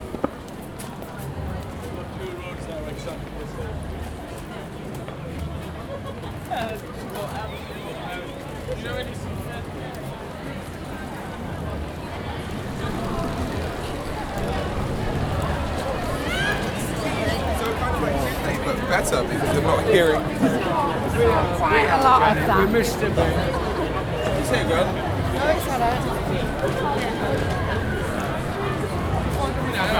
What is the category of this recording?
Soundscapes > Urban